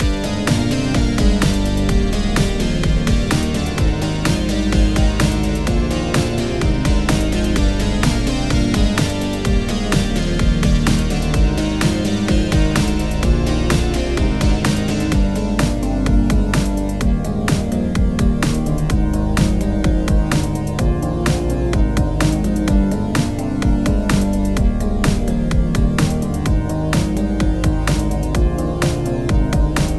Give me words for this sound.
Music > Multiple instruments
Fight Game Loop - Bravado
I’ll be happy to adjust them for you whenever I have time.